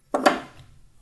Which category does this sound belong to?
Sound effects > Other mechanisms, engines, machines